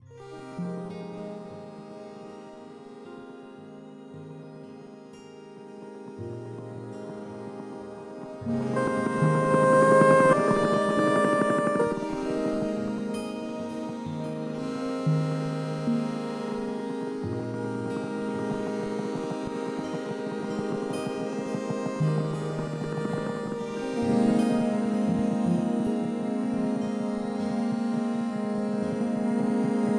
Soundscapes > Synthetic / Artificial
day dreaming by the lake
drone, ethereal, soundscape, sleepy, dreamy, ambient, evolving, space